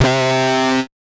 Instrument samples > String
made in furnace, very easy to make.